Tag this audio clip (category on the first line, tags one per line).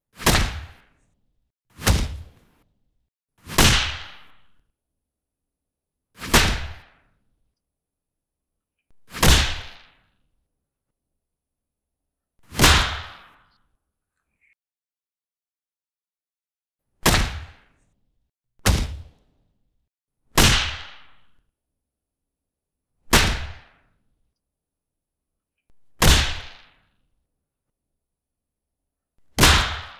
Sound effects > Human sounds and actions
anime
arm
attack
bang
battle
boom
brawl
combat
decking
explosion
fate
fight
fighter
fighting
firecracker
heavy
hit
impact
karate
kick
kicking
koyama
kung-fu
martialarts
melee
punch
punches
punching
TMNT
yasomasa